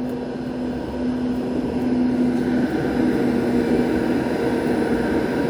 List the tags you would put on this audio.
Soundscapes > Urban
Rattikka Tram TramInTampere

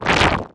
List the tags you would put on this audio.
Sound effects > Electronic / Design
Game OneShot shit cake Effect Movie Synthtic Cartoon FX Rumble

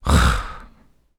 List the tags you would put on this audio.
Speech > Solo speech
annoyed dialogue FR-AV2 grumpy Human Male Man Mid-20s Neumann NPC oneshot singletake Single-take talk Tascam U67 upset Video-game Vocal voice Voice-acting

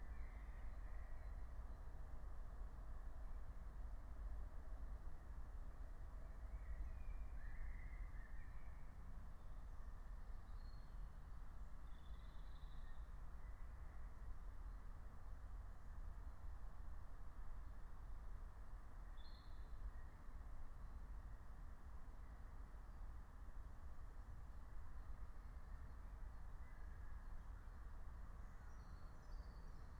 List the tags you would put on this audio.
Soundscapes > Nature
natural-soundscape field-recording raspberry-pi meadow phenological-recording

